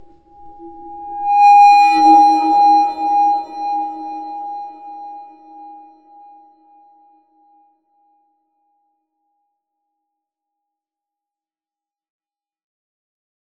Sound effects > Electronic / Design
Creeper 2 - Dish Plate Feedback
Heavily edited and processed foley samples originated from an odd source - scratching dish plates together! This one contains more or less a strong resonating feedback.
dish, edited, feedback, foley, plates, processed